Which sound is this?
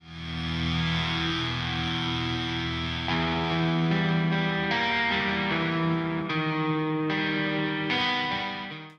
Music > Other
depressive BM electric guitar sample
electric, sample